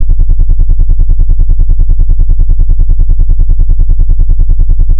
Sound effects > Electronic / Design
20 ㎐ + 30 ㎐ + 40 ㎐ blended together for kickbuilding/ drumbuilding/ soundbuilding. Any frequency merger produces higher and lower frequencies (see: wave mechanics) thus you have to re-EQ (re-equalize) the mixdown.
harmonics, sinewave, soundbuilding, sinewaves, Hz, bassbase, bassthrob, hum, 20-Hz, subspectral, 40-Hz, Fourier, electronic, ultrabass, deep, sinemix, Lissajous, 30-Hz, bass, sinusoid, kicksine, fundamental, basspulse, fundamentals, low-end, superbass, megabass